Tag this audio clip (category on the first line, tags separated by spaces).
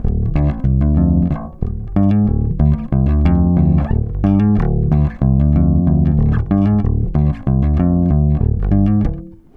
Instrument samples > String
fx loop loops mellow oneshots pluck plucked slide